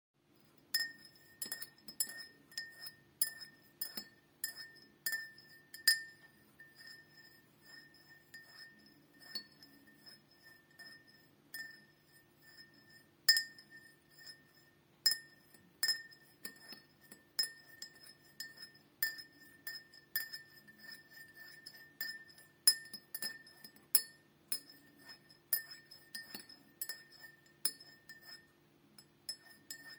Sound effects > Objects / House appliances
Stirring Tea with a Spoon
A teaspoon clinking against the side of a teacup while the tea is being stirred in a circular motion